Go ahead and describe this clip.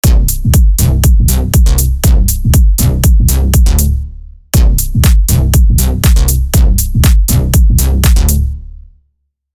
Instrument samples > Percussion
Ableton Live. VST.Serum......Drum and Bass Free Music Slap House Dance EDM Loop Electro Clap Drums Kick Drum Snare Bass Dance Club Psytrance Drumroll Trance Sample .
Bass, Clap, Dance, Drum, Drums, EDM, Electro, Free, House, Kick, Loop, Music, Slap, Snare